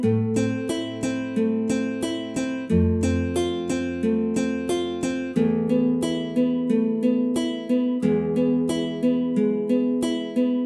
Music > Solo instrument
Tense Chords Am-F-Esus4-E 90bpm
acoustic, music, guitar, Progression